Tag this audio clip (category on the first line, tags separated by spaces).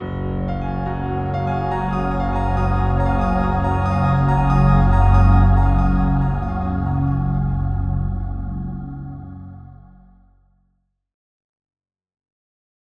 Music > Multiple instruments
beautiful-crescendo begin-new-game bright-crescendo crescendo epic-crescendo epic-riser gentle-riser intense-riser intense-swell intro musical-swell new-game-music new-game-theme outro podcast-intro podcast-outro powerful-crescendo powerful-riser riser soothing-crescendo soothing-riser start-new-game